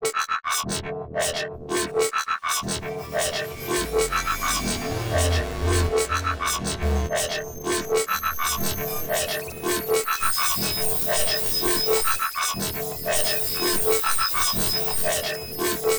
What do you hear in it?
Soundscapes > Synthetic / Artificial
Grain Space 5
samples, soundscapes